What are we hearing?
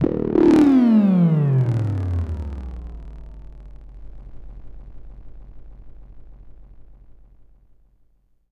Sound effects > Experimental
Analog Bass, Sweeps, and FX-070
oneshot, sample, electro, pad, analog, sfx, retro, scifi, bassy, snythesizer, mechanical, complex, robot, machine, korg, basses, weird, vintage, trippy, effect, sweep, electronic, sci-fi, bass, robotic, fx, analogue, synth, dark, alien